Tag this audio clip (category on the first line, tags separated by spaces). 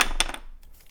Sound effects > Objects / House appliances
bonk clunk fieldrecording foley foundobject fx industrial mechanical metal natural object oneshot perc percussion sfx